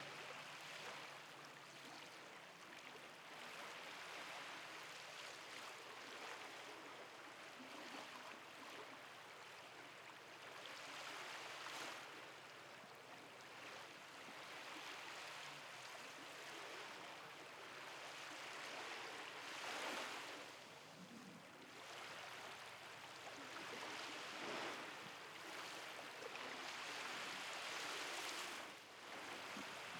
Soundscapes > Nature
Sea Sennheiser MKE 600
Shotgun recording with Sennheiser MKE 600 recorded with Zoom H-6.
CALM, OUTDOOR, SEA, AMBIENCE